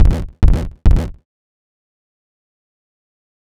Instrument samples > Synths / Electronic
Deep Pads and Ambient Tones3
synthetic, Dark, bassy, Synthesizer, Note, Ominous, bass, Pads, Haunting, Oneshot, Pad, Tone, Ambient, Digital, Chill, Deep, Tones, Analog, Synth